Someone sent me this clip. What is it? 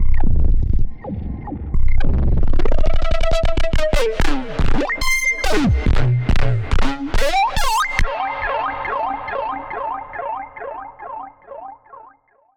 Instrument samples > Synths / Electronic
CVLT BASS 15
bassdrop, lowend, subbass, wavetable, subwoofer, subs, synthbass, low, wobble, lfo, clear, synth, bass, sub, drops, stabs